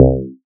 Instrument samples > Synths / Electronic
Synthesized instrument samples